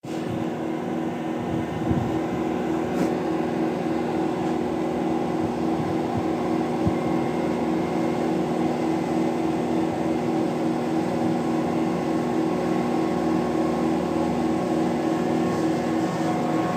Soundscapes > Urban
spooky dronal vacuum truck
Recorded in my parking lot using iPhone voice recorder and processed in Reaper.
noise, drone, soundscape